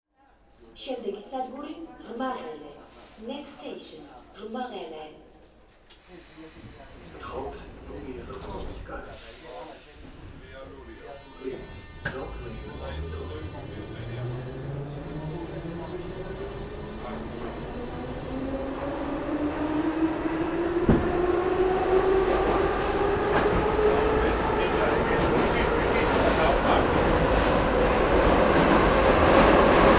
Soundscapes > Urban
Tbilisskoe Metro
A metro train in Tbilisi travelling several stations. Recorded by Zoom H1n from the inside of the car.
announcement, field-recording, Georgia, Georgian, metro, platform, rail, railway, railway-station, station, subway, Tbilisi, train, train-station, transport, tube, underground